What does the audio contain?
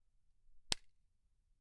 Sound effects > Human sounds and actions
slap Tr1
Someone slapping their face. Recorded on a zoom recorder.
hit, slap, face, impact